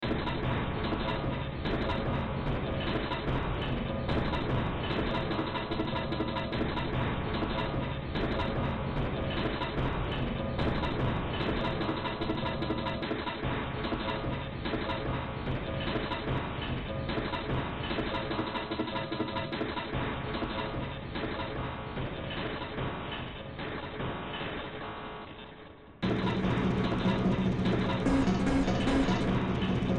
Music > Multiple instruments
Demo Track #3521 (Industraumatic)
Ambient, Cyberpunk, Games, Horror, Industrial, Noise, Sci-fi, Soundtrack, Underground